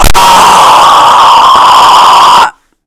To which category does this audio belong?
Sound effects > Electronic / Design